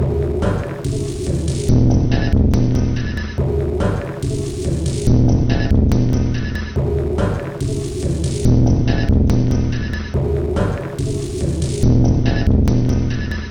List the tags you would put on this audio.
Instrument samples > Percussion
Industrial
Drum
Packs
Samples
Loopable
Ambient
Dark
Loop
Alien
Weird

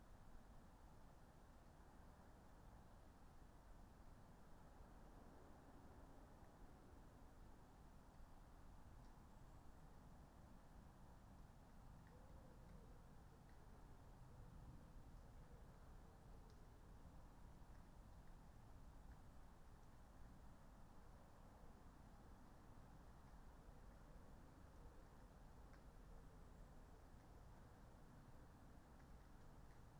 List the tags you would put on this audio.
Soundscapes > Nature
weather-data
soundscape
field-recording
artistic-intervention
data-to-sound
alice-holt-forest
nature
sound-installation
modified-soundscape
natural-soundscape
phenological-recording
raspberry-pi
Dendrophone

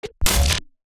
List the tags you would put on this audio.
Sound effects > Experimental
abstract
alien
clap
crack
edm
experimental
fx
glitch
glitchy
hiphop
idm
impact
impacts
laser
lazer
otherworldy
perc
percussion
pop
sfx
snap
whizz
zap

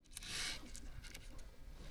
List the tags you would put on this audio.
Sound effects > Objects / House appliances
screw
plastic
drag

